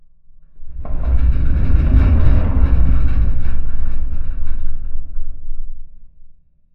Sound effects > Other
metal rattle push
shopping cart being pushed. recorded with a zoom F3, homemade piezo pickup, and a diy piezo preamp kit
bass, bassy, cart, deep, low, mechanical, pushing, rumble, rumbling